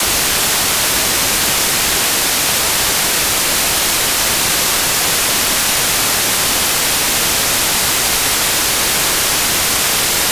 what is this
Instrument samples > Synths / Electronic
NOISE Studio Electronics Omega
Noise Oscillator - Studio Electronics Omega :)
Analog, Noise, SE, Studio-Electronics